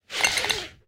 Sound effects > Other
combo fire ice
31 - Combined Fire and Ice Spells Sounds foleyed with a H6 Zoom Recorder, edited in ProTools together